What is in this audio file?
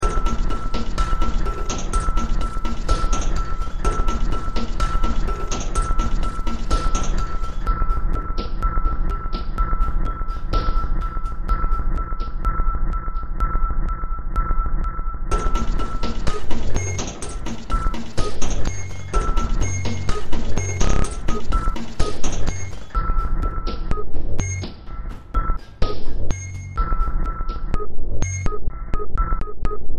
Music > Multiple instruments
Demo Track #3475 (Industraumatic)
Track taken from the Industraumatic Project.